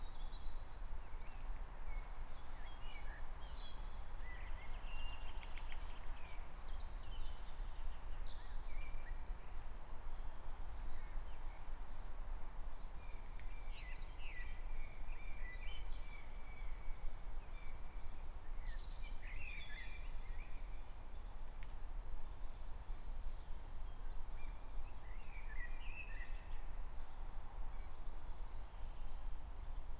Soundscapes > Nature
Recorded 15:32 12/04/25 A saturday afternoon on a trail near the Korrö hostel. Some of the birds heard are: Mallards, seagulls, blackbirds, blue tits, great tits, robins and a wagtail. About 100m from the trail is a highway, and there’s also a river closeby but it’s not that audible. Zoom H5 recorder, track length cut otherwise unedited.